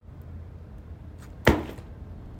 Sound effects > Objects / House appliances
Sound of my lunch box falling on carpet. Used my Iphone 13 to record in voice memos
Falling, carpet, Box